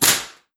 Sound effects > Other mechanisms, engines, machines
TOOLPneu-Samsung Galaxy Smartphone Nail Gun, Burst 02 Nicholas Judy TDC
A nail gun burst.
burst, Phone-recording, pneumatic